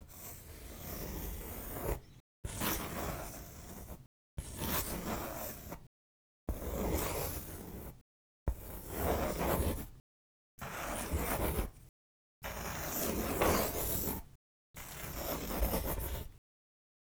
Sound effects > Objects / House appliances
Pencil circular motion
Pencil scribbles/draws/writes/strokes in a circular motion.
draw, pencil, write